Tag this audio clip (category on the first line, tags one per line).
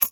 Sound effects > Other
change
jingle
small
ui
money
ring
glint
interface
metallic
loose
coins
cash
game